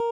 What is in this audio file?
Instrument samples > String
A random guitar tone that is shortened. Good for experiments. Good for sound design. The pack contains tones that create an arpeggio one after the other.
cheap, stratocaster, sound, design, tone, arpeggio, guitar